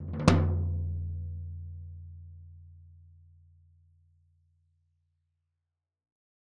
Music > Solo percussion
floor tom-Fill Ending - 16 by 16 inch
acoustic, drums, flam, perc, percussion, roll, studio, tomdrum